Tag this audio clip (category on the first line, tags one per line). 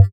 Instrument samples > Synths / Electronic
bass
fm-synthesis